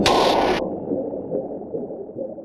Sound effects > Electronic / Design
Impact Percs with Bass and fx-015
bash, bass, brooding, cinamatic, combination, crunch, deep, explode, explosion, foreboding, fx, hit, impact, looming, low, mulit, ominous, oneshot, perc, percussion, sfx, smash, theatrical